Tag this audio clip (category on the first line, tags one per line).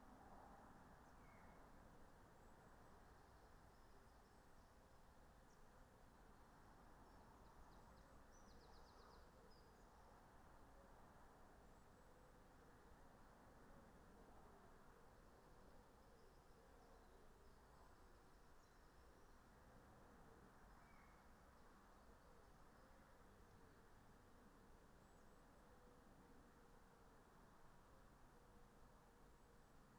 Soundscapes > Nature
nature
sound-installation
weather-data
data-to-sound
phenological-recording
natural-soundscape
alice-holt-forest
soundscape
Dendrophone
field-recording
modified-soundscape
raspberry-pi
artistic-intervention